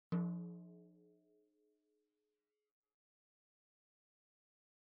Music > Solo percussion
Hi Tom- Oneshots - 18- 10 inch by 8 inch Sonor Force 3007 Maple Rack
drums, rim, percussion, perc, acoustic, percs, roll, tom, drumkit, toms, instrument, hitom, hi-tom, flam, fill, drum, velocity, beatloop, kit, tomdrum, rimshot, studio, beat, oneshot, beats